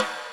Music > Solo percussion
Snare Processed - Oneshot 180 - 14 by 6.5 inch Brass Ludwig
flam, kit, drum, hits, brass, snareroll, sfx, drums, acoustic, processed, snare, fx, rimshot, oneshot, perc, ludwig, crack, reverb, realdrums, roll, realdrum, drumkit, snares, beat, rim, rimshots, hit, snaredrum, percussion